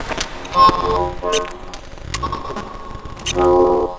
Sound effects > Experimental
This pack focuses on sound samples with synthesis-produced contents that seem to feature "human" voices in the noise. These sounds were arrived at "accidentally" (without any premeditated effort to emulate the human voice). This loop was created with help from Sonora Cinematic's incredible 'Harmonic Bloom' tool, which extracts harmonics from "noisy" source material. This process is another one which often results in chattering or "conversational" babble that approximates the human voice.
vocal, Harmonic-Bloom, pareidolia, loop, shaped-noise, apophenia, harmonic-extractor, 120bpm